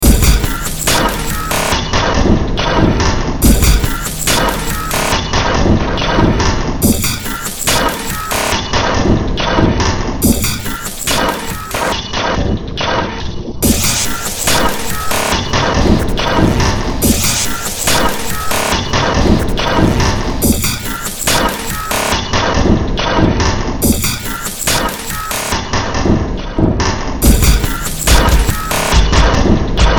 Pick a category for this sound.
Music > Multiple instruments